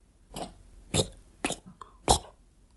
Sound effects > Human sounds and actions
Sputtering sound from the mouth, like someone coughing up blood and dying. Recorded using iPhone SE and edited with Audacity.
cough, death, Sputtering